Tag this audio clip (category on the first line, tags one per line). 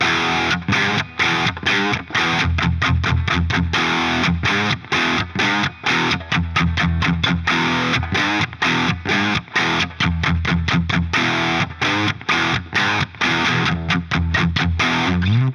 Music > Solo instrument
metal
guitar
rock